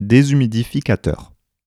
Speech > Solo speech
Subject : A mid 20s dude saying Dehumidifier in French Date YMD : 2025 06 05 - 16h Location : Albi France Hardware : Tascam FR-AV2, Shure SM57 with A2WS wind-cover. Weather : Sunny day, mostly blue ideal pockets of clouds. 23°c 10km/h wind. Processing : Trimmed and Normalized in Audacity. Probably some fade in/out.